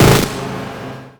Instrument samples > Synths / Electronic
Bleepdrum Snare 01
Analog, Bleep, Circuit-Bend, Clap, Drum, Drums, Electronic, Hi-Hats, Kick, Lo-Fi, Snare